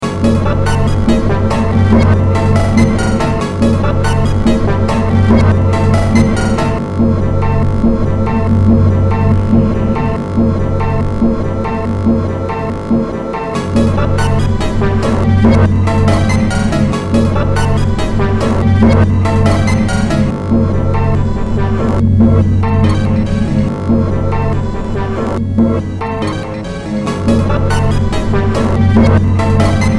Music > Multiple instruments
Short Track #3902 (Industraumatic)
Horror, Soundtrack, Cyberpunk, Ambient, Underground, Noise, Industrial, Games